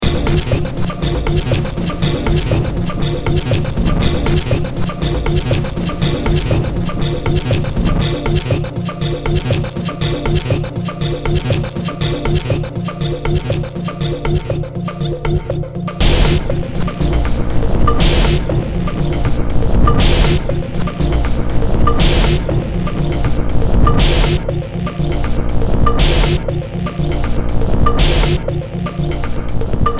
Music > Multiple instruments
Demo Track #3735 (Industraumatic)
Ambient, Cyberpunk, Games, Horror, Industrial, Noise, Sci-fi, Soundtrack, Underground